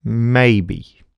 Speech > Solo speech
Doubt - Maayybe
Single-take, oneshot, NPC, Voice-acting, Male, maybe, Video-game, voice, doubt, skepticism, FR-AV2, singletake, dialogue, unsure, Human, talk, Vocal, Mid-20s, skeptic, Man, Tascam, U67, Neumann